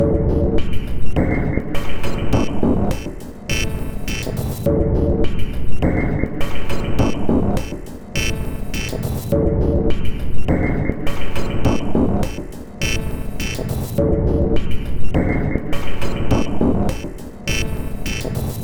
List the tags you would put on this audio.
Instrument samples > Percussion
Ambient
Dark
Industrial
Loopable
Soundtrack
Underground

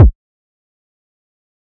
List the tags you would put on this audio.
Instrument samples > Percussion
FX
percussion
game
8-bit

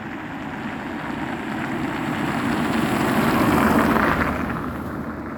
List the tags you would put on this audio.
Sound effects > Vehicles
moderate-speed
asphalt-road

